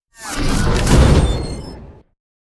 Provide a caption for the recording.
Sound effects > Other mechanisms, engines, machines
Big robot footstep 004
Big robot footstep SFX ,is perfect for cinematic uses,video games. Effects recorded from the field.
footstep, impact, deep, resonant